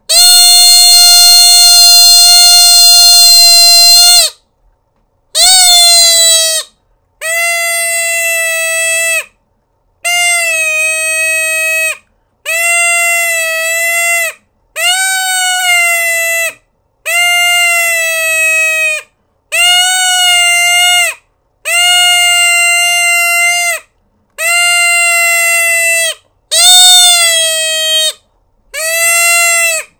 Sound effects > Objects / House appliances
HORNCele-Blue Snowball Microphone, CU Predator Call or High Pitched Party Horn Nicholas Judy TDC
A predator call or a high-pitched party horn.